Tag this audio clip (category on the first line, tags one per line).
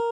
Instrument samples > String
tone
sound
guitar
stratocaster
arpeggio
design
cheap